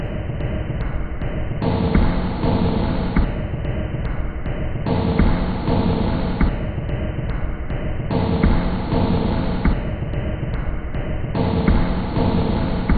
Soundscapes > Synthetic / Artificial
This 148bpm Ambient Loop is good for composing Industrial/Electronic/Ambient songs or using as soundtrack to a sci-fi/suspense/horror indie game or short film.
Alien, Ambient, Dark, Drum, Industrial, Loop, Loopable, Packs, Samples, Soundtrack, Underground, Weird